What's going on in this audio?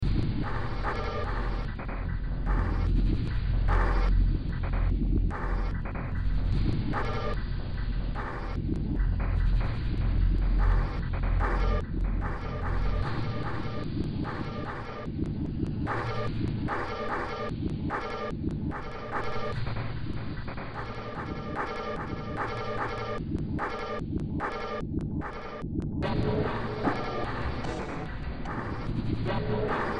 Music > Multiple instruments
Demo Track #3402 (Industraumatic)
Underground, Industrial, Games, Cyberpunk, Horror, Soundtrack, Noise, Ambient, Sci-fi